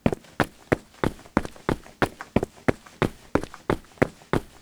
Sound effects > Human sounds and actions
FPS Footsteps Loop
Shoes on stone floor walk sound loop. Edited in Audacity.
animation, boot, boots, concrete, feet, field-recording, first-person, foot, footstep, footsteps, footsteps-loop, game, game-footsteps, gaming, hard, hiking, loop, rock, run, shoe, shoes, sound-loop, sprint, sprinting, step, steps, stone, surface, walk, walking